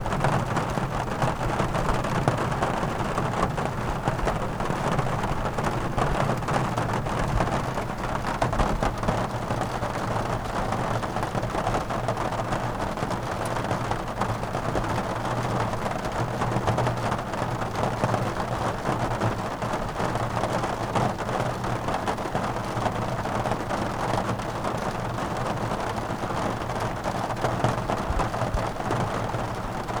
Soundscapes > Nature
Light Autumn Rain on the Metal Roof of the Car #002
The sound of November rain on the metal roof of the car
autumn, car, field-recording, metal, rain, raining, water, weather